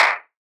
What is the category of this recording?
Instrument samples > Percussion